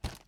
Sound effects > Objects / House appliances
Sound used originally for the action of putting a paper bag down. Recorded on a Zoom H1n & Edited on Logic Pro.
Crunch, Foley, PaperBag, Rustle